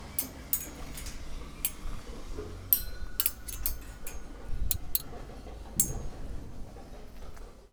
Sound effects > Objects / House appliances
Junkyard Foley and FX Percs (Metal, Clanks, Scrapes, Bangs, Scrap, and Machines) 148
Dump, Clank, garbage, Metallic, Atmosphere, Smash, SFX, Robotic, Robot, Junk, waste, Machine, Metal, Foley, Bash, Bang, rattle, Ambience, dumping, Clang, trash, Percussion, scrape, Perc, Environment, FX, rubbish, dumpster, tube, Junkyard